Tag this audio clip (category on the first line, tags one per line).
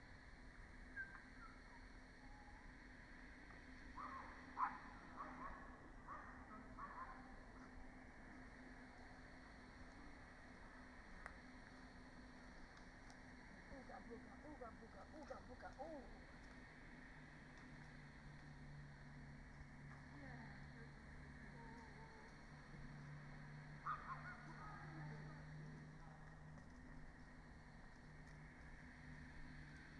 Soundscapes > Nature
weather-data
alice-holt-forest
raspberry-pi
artistic-intervention
data-to-sound
nature
sound-installation
Dendrophone
modified-soundscape
natural-soundscape
phenological-recording
field-recording
soundscape